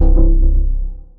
Instrument samples > Synths / Electronic
CVLT BASS 89
bass, bassdrop, clear, drops, lfo, low, lowend, stabs, sub, subbass, subs, subwoofer, synth, synthbass, wavetable, wobble